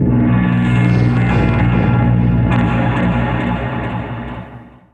Instrument samples > Synths / Electronic
CVLT BASS 63
bass, bassdrop, clear, drops, lfo, low, lowend, stabs, sub, subbass, subs, subwoofer, synth, synthbass, wavetable, wobble